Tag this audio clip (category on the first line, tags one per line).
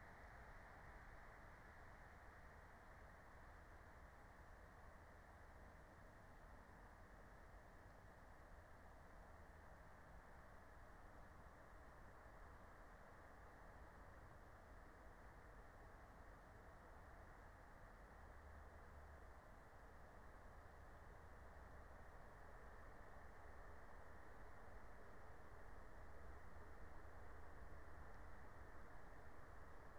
Soundscapes > Nature
natural-soundscape
meadow
field-recording
raspberry-pi
alice-holt-forest
phenological-recording
nature
soundscape